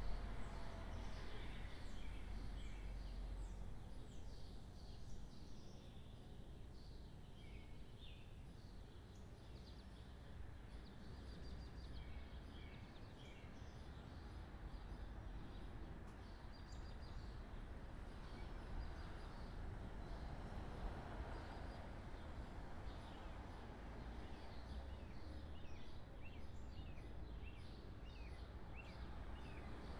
Soundscapes > Nature
Birds and traffic
Sounds of traffic and birds singing from the window of my home office in Virginia.